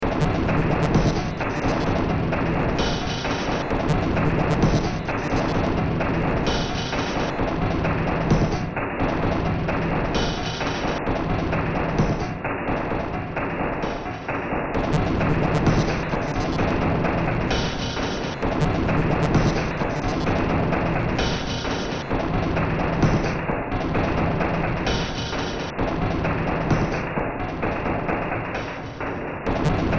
Music > Multiple instruments
Short Track #3432 (Industraumatic)
Track taken from the Industraumatic Project.
Games, Horror, Noise, Sci-fi, Soundtrack, Underground